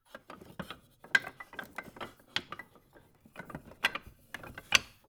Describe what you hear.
Music > Solo instrument
Sifting Through Loose Marimba Keys Notes Blocks 20
block, foley, fx, keys, loose, marimba, notes, oneshotes, perc, percussion, rustle, thud, tink, wood, woodblock